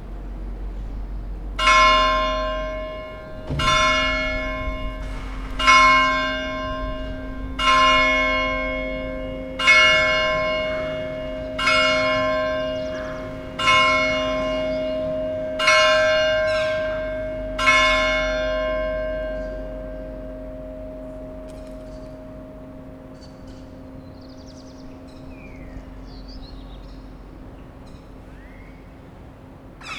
Sound effects > Other
Clocher Tour de L'Horloge MS RAW - 2025 04 02 Rivesaltes 08h02
Subject : Recording a bell of the "Tour De L'horlogue" in rivesaltes Date YMD : 2025 04 02 Location : Rivesaltes 66600 Pyrénées-Orientales, Occitanie, France. Hardware : Zoom H2N MS RAW mode. Weather : Grey Sky, Little to no wind. Processing : Trimmed and Normalized in Audacity.
Morning, Pyrenees-Orientales, 2025, Occitanie, Southern-France, H2n, 66600, France, Rivesaltes, South, MS, Zoom